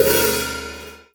Music > Solo instrument
Vintage Custom 14 inch Hi Hat-016
Custom, Cymbal, Cymbals, Drum, Drums, Hat, Hats, HiHat, Kit, Metal, Oneshot, Vintage